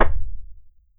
Sound effects > Human sounds and actions
LoFiFootstep Carpet 04
Shoes on carpet. Lo-fi. Foley emulation using wavetable synthesis.
footstep, footsteps, synth, walk, walking